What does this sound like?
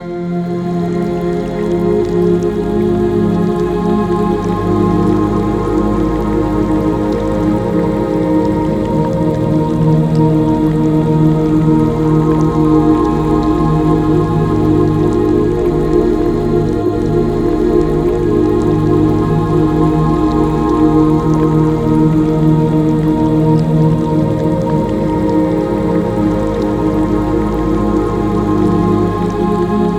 Soundscapes > Other
Meditative Ethno Spa (After Hours Loopable Edit)
Same sound, no effects processing. Just downsampled and made loopable, enjoy!
Calming, Loopable, Nature, Rain, Relaxing, Soothing, Vocal, Water